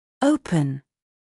Speech > Solo speech
to open
english, pronunciation, voice, word